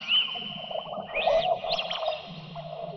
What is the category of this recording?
Soundscapes > Synthetic / Artificial